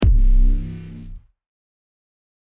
Instrument samples > Synths / Electronic
Synth bass made from scratch Key: C I think.....